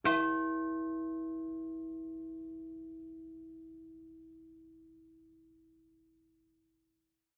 Instrument samples > Other
A bell sound played on guitar recorded through a boss katana amp mic'd with an sm57